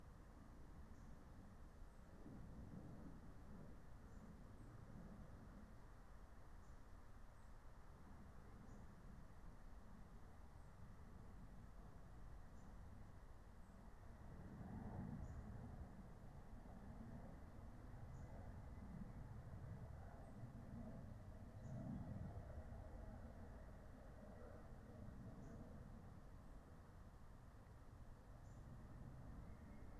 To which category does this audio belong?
Soundscapes > Nature